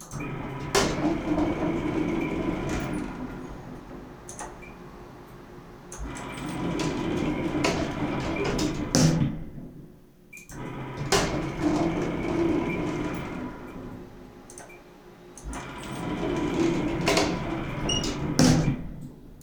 Sound effects > Other mechanisms, engines, machines
Old elevator door opens and closes twice.
The door of and old 80´s Otis elevator opens and closes twice.
door, opening, elevator, closing